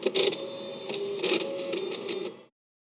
Sound effects > Other mechanisms, engines, machines
Receipt Machine
GENERATOR, machine, machinery, Operation